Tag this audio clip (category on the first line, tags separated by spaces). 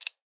Sound effects > Human sounds and actions
activation button interface